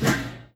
Sound effects > Objects / House appliances
DOORAppl-Samsung Galaxy Smartphone, CU Charcoal Grill, Open Nicholas Judy TDC
A charcoal grill lid open.
charcoal, foley, grill, lid, open, Phone-recording